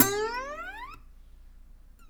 Music > Solo instrument
acoustic guitar slide4
acosutic guitar chord chords string strings pretty dissonant riff solo instrument slap twang knock
acosutic, chord, chords, dissonant, guitar, knock, pretty, solo, string, twang